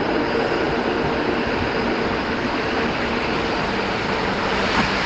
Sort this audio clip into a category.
Sound effects > Vehicles